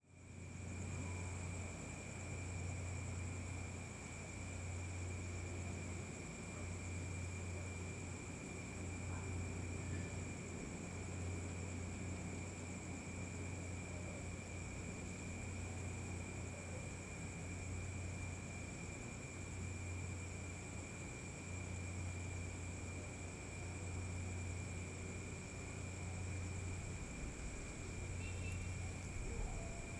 Soundscapes > Nature
250729 2927 PH Breezy night in a calm Filipino suburban area
Breezy night atmosphere in a calm Filipino suburban area. (take 2) I made this recording at about 1:40AM, from the terrace of a house located at Santa Monica Heights, which is a costal residential area near Calapan city (oriental Mindoro, Philippines). One can hear the atmosphere of this place during a warm breezy night, with light wind in the leaves and plants, crickets and insects chirping, and in the distance, some human voices, machineries, cows mowing, dog barking, and more. Recorded in July 2025 with an Olympus LS-P4 and a Rode Stereo videomic X (SVMX). Fade in/out applied in Audacity.
ambience, atmosphere, barking, breeze, Calapan-city, calm, chirp, chirping, chirrup, cow, cows, crickets, distant, dog, dogs, field-recording, insects, mowing, night, Philippines, soundscape, suburban, voices